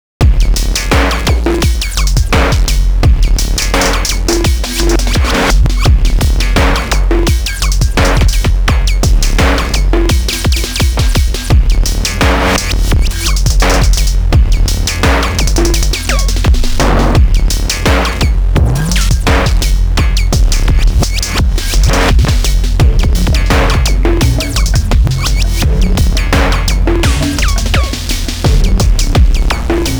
Music > Multiple instruments
new wave industrial glitchy edm idm beats loops patterns percussion melody melodies drumloop bass hip hop
bass, beats, drumloop, edm, glitchy, hip, hop, idm, industrial, loops, melodies, melody, new, patterns, percussion, wave